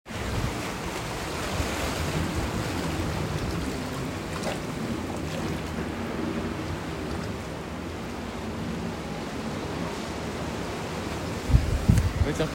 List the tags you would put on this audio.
Soundscapes > Nature
beach
Calanques

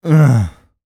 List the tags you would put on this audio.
Speech > Solo speech
MKE600
MKE-600

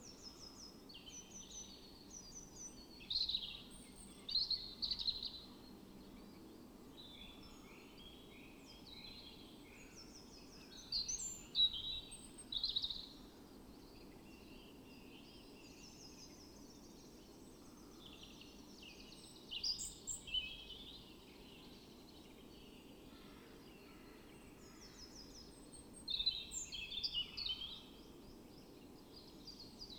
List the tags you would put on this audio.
Soundscapes > Nature
modified-soundscape
sound-installation
nature
phenological-recording
raspberry-pi
data-to-sound
field-recording
alice-holt-forest
natural-soundscape
weather-data
soundscape

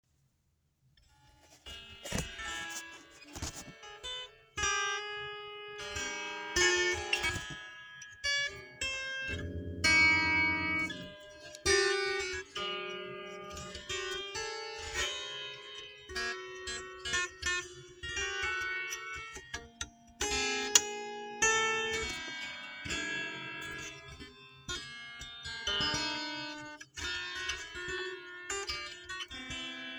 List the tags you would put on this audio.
Instrument samples > String
harp
music
strings

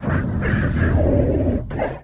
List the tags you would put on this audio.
Speech > Processed / Synthetic
demonic
horror
voice
dark
deep

